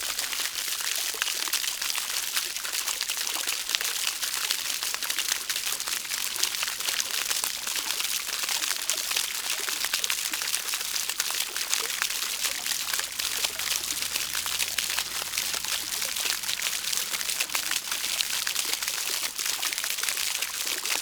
Sound effects > Natural elements and explosions
250710 20h27 Esperaza Place de la laïcité - Southern side - Close-up of bottom of fountain - MKE600
Subject : Recording the fountain from Place de la laicité in Esperaza. Here focusing on the bottom of the fountain where the water splats on the sothern side. Sennheiser MKE600 with stock windcover P48, no filter. Weather : Processing : Trimmed in Audacity.